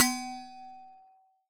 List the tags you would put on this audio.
Sound effects > Objects / House appliances
percusive recording sampling

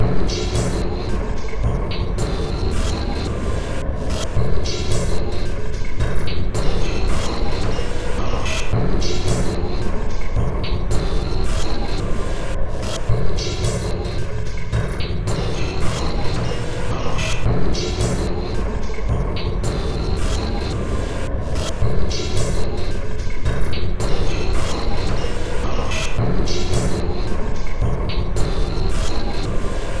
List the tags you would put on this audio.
Instrument samples > Percussion
Ambient Weird Underground Dark Soundtrack Alien Drum Packs

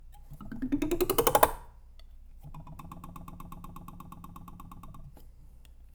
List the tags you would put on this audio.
Sound effects > Objects / House appliances
Beam Clang ding Foley FX Klang Metal metallic Perc SFX ting Trippy Vibrate Vibration Wobble

